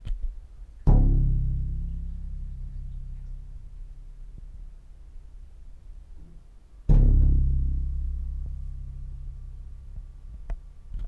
Sound effects > Objects / House appliances
recorded on Zoom h1n
resonance, string